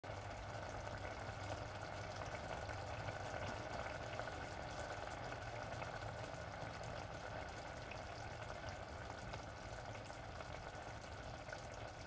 Sound effects > Objects / House appliances
Sizzling food 02
Kitchen cooking sound recorded in stereo.
Kitchen, Cooking, Food, Household